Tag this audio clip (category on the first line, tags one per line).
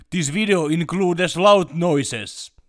Speech > Solo speech
speech
Male